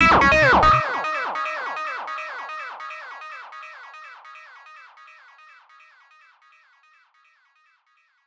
Instrument samples > Synths / Electronic
Psytrance One Shot 03
Psytrance Sample Packs
goa-trance, goatrance, psytrance, psy-trance, psy, 145bpm, lead, goa, trance